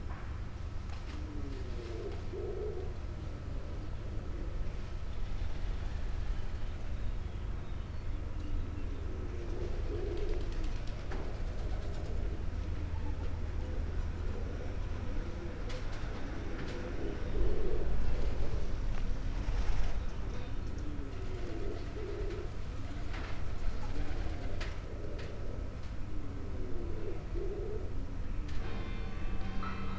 Soundscapes > Urban
250725 09h15 Albi Cloître Saint Salvy - OKM1
Subject : Cloître Saint Salvy ambience, facing north. Date YMD : 2025 July 25 Location : Albi 81000 Tarn Occitanie France. Soundman OKM1 Binaural in ear microphones. Weather : Light grey sky (with small pockets of light). A few breezes About 16°c Processing : Trimmed and normalised in Audacity.
City, July, grey-sky, OKM1, OKM-I, Tascam, France, field-recording, cloister, in-ear-microphones, Tarn, Albi, 81000, Occitanie, Binaural, cloitre, 2025, Morning, Soundman, FR-AV2, Friday, garden, in-ear